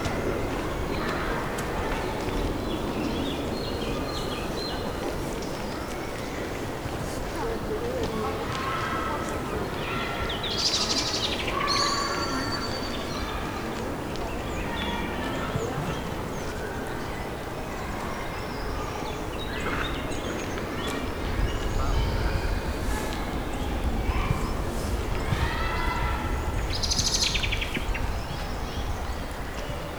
Soundscapes > Nature
20250312 Collserola Goldfinch
Collserola, Goldfinch, Nature